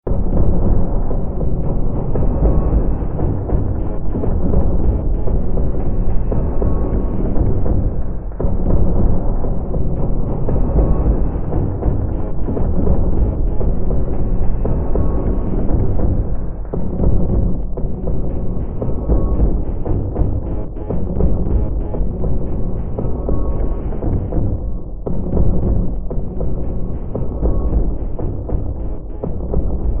Soundscapes > Synthetic / Artificial
Looppelganger #144 | Dark Ambient Sound
Hill, Ambient, Silent, Survival, Sci-fi, Weird, Games, Underground, Darkness, Drone, Soundtrack, Noise, Gothic, Ambience, Horror